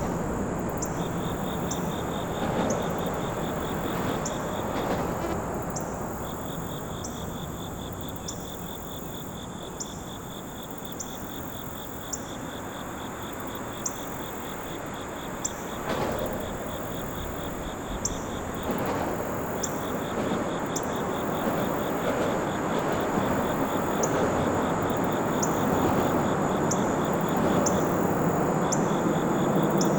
Soundscapes > Urban
AMBSubn-Summer Under the Bridge onto the Island, overhead traffic, crickets birdsong 630AM QCF Gulf Shores Sony IC Recorder
Underneath the Main Bridge onto the Island, Gulf Shores, Alabama. Overhead traffic, morning crickets and birdsong. Summer Morning.
Traffic, Morning, Birdsong, Summer, Bridge, Crickets, Island